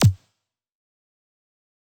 Sound effects > Electronic / Design
button select1
Made in FL Studio, with FLEX plugin, and the Drum Analog Kit, inside, there is a sound Button click
Button, game, SFX